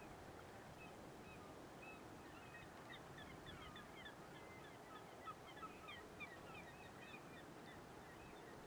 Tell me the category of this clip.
Soundscapes > Nature